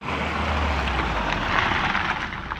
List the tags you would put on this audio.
Sound effects > Vehicles
car combustionengine

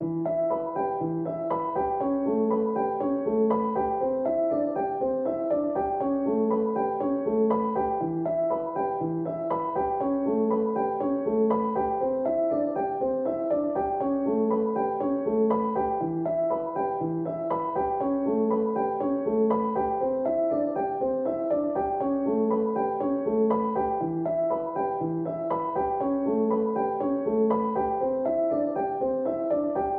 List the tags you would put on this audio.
Music > Solo instrument
120 simple simplesamples music loop samples free pianomusic 120bpm piano reverb

